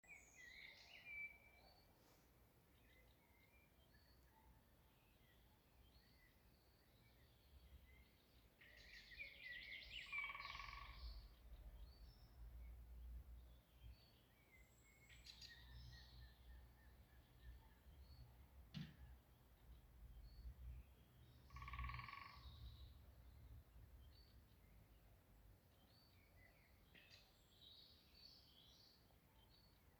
Soundscapes > Urban
Roadside recording with phone microphone, 8pm in April. Finland. Various birdsong and woodpeckers, intermittent traffic, some human voices.